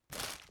Sound effects > Objects / House appliances
Sound used originally for the action of picking up a paper bag. Recorded on a Zoom H1n & Edited on Logic Pro.
Rustle, Crunch, Foley, PaperBag